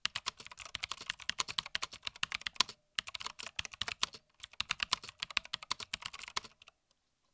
Sound effects > Other mechanisms, engines, machines
Very Fast Typing Longer
Very fast typing on a mechanical keyboard. Recorded using a Pyle PDMIC-78
key, keyboard, tapping, typing